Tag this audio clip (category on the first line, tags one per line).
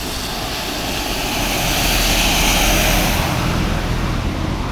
Sound effects > Vehicles
transportation
bus